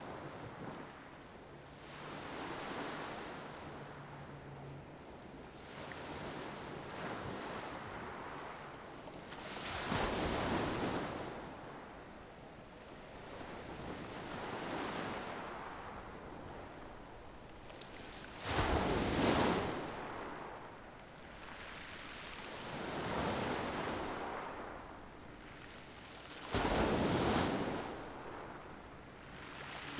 Soundscapes > Nature
Beach ambience recorded in Calanque Saint Barthélémy, Saint-Raphaël, France Small/tiny waves crashing on the beach's peebles. Some traffic from the road. Recorded with a tascam DR40.